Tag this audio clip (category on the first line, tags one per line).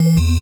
Sound effects > Electronic / Design
interface
menu
button
alert
options
notification
UI
digital